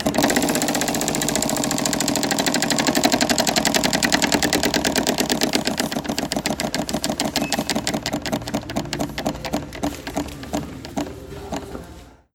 Sound effects > Objects / House appliances
GAMECas-Samsung Galaxy Smartphone, CU Spin To Win Wheel Nicholas Judy TDC
A spin to win wheel. Recorded at Shoe Carnival.